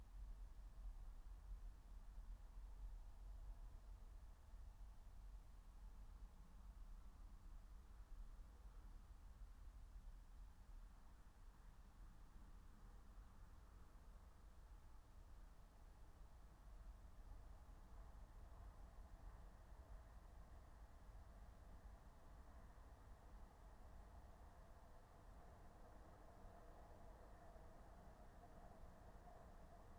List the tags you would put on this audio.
Soundscapes > Nature
soundscape nature alice-holt-forest meadow

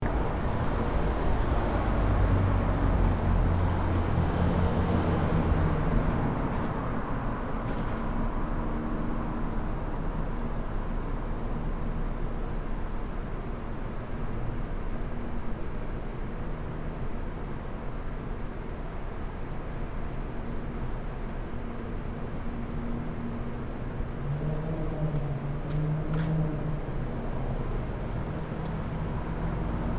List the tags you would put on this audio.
Soundscapes > Urban
traffic street cars city

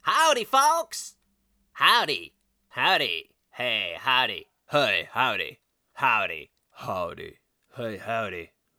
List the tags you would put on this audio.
Speech > Solo speech
american english hello hey hi howdy male man speech voice

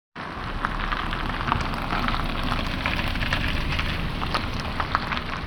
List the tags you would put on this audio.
Sound effects > Vehicles
Car field-recording

